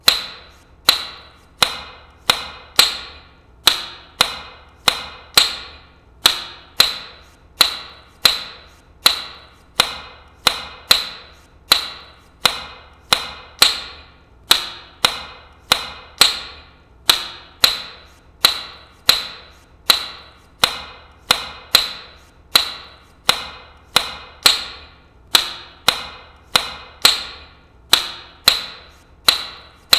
Sound effects > Objects / House appliances
TOONPop-CU Pop Guns Nicholas Judy TDC
Pop guns popping.
pop-gun, Blue-Snowball, Blue-brand, pop, foley, cartoon